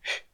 Sound effects > Human sounds and actions
A simple blowing sound made by my mouth, I use this for my game dev for stealth games that involve blowing out candles to remove lights.
Puff, Blow, Game